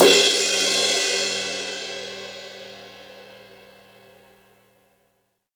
Instrument samples > Percussion
crash Zildjian 16 inches bassized very long
bang, China, clang, crash, crunch, cymbal, metal, multicrash, spock, Stagg, Zultan